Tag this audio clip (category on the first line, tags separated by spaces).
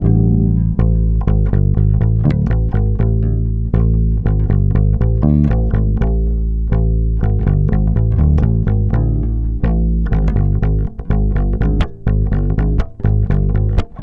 Instrument samples > String
bass blues charvel fx loop loops mellow oneshots pluck plucked riffs rock slide